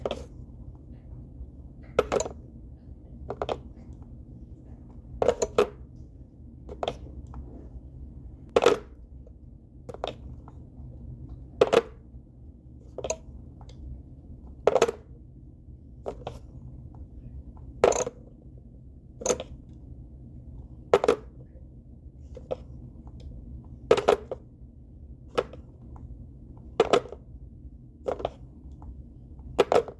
Sound effects > Objects / House appliances
TOYMisc-Samsung Galaxy Smartphone, CU Chatter Telephone, Receiver, Pick Up, Hang Up Nicholas Judy TDC
A chatter telephone receiver being picked up and hanged up.
chatter-telephone, hang-up, Phone-recording, pick-up, receiver